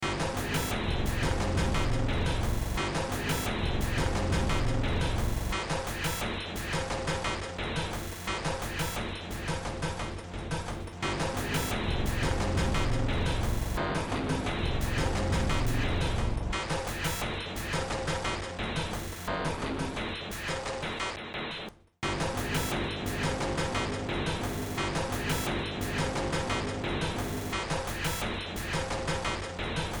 Music > Multiple instruments
Short Track #3263 (Industraumatic)
Ambient, Cyberpunk, Soundtrack, Sci-fi, Underground, Industrial, Horror, Games, Noise